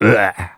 Speech > Solo speech
Yuck Interjection Man
Blueagh. Yuck. Blargh! Male vocal recorded using Shure SM7B → Triton FetHead → UR22C → Audacity → RX → Audacity.
disgusted, emotion, human, interjection, male, not-cool, uncool, vocal